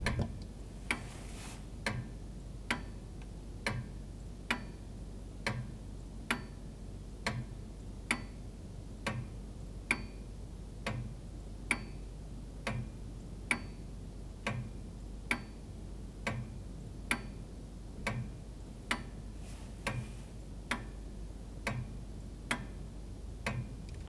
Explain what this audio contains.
Sound effects > Objects / House appliances
The sound of a longcase clock ticking recorded from inside the clock housing.
clock, grandfather, tick